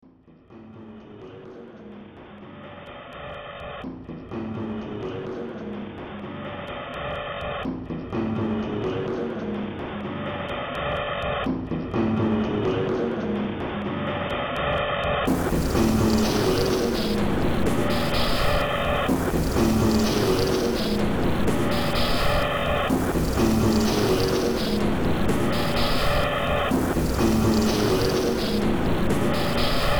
Music > Multiple instruments
Demo Track #3763 (Industraumatic)
Underground, Sci-fi, Cyberpunk